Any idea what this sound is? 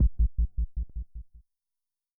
Instrument samples > Percussion
Kick-Jomox Alpha Base-LFO
Sound from my drum machine Jomox Alpha Base
base, drum, jomox, kick